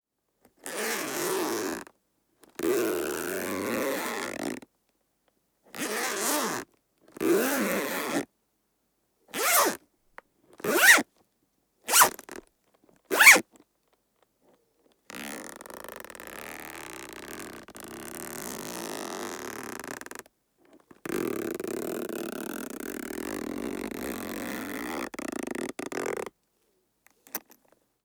Sound effects > Objects / House appliances
The sound of a zipper lock. Recorded on Tascam Portacapture X8. Please write in the comments where you plan to use this sound. Don't forget to put five stars in the rating.
clothes, closure, buckle, bag, suitcase, fastening, whack, fastener, lock, zip, fly, clasp, zipper, crack